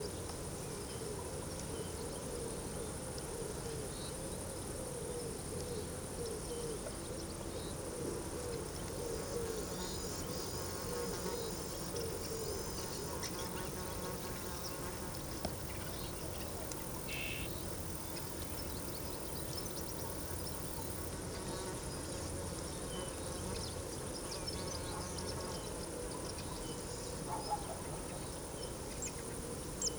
Soundscapes > Nature
la vilaine summer meadow pm3
Large Meadow in a sunny summer afternoon in a swarmy field, near the river "La vilaine". Insects as flies and orthoptera, birds are (according to Merlin): wood pigeon, turkish turtle, goldfinch, zitting cisticola, ...), a small shepp's bell and other discreet presence. Rich and lively ambiance.
afternoon, ambiance, ambience, biophonic, birds, britany, countryside, europe, field, field-recording, france, insects, meadow, nature, orthoptera, summer